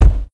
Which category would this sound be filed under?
Sound effects > Other